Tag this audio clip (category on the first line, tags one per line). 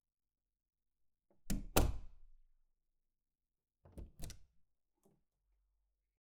Sound effects > Objects / House appliances
close
creak
door
foley
handle
hinge
house
interior
latch
mechanical
movement
open
openclose
room
sfx
wood